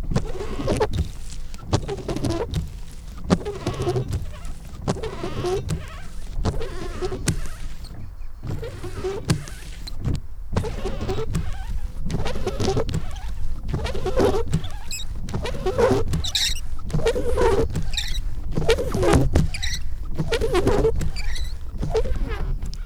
Sound effects > Vehicles
Ford 115 T350 - Windscreen wipers (wet)
115, Ford-Transit, August, France, A2WS, Single-mic-mono, Vehicle, 2003-model, Old, Van, 2003, Ford, T350, Tascam, SM57, FR-AV2, 2025, Mono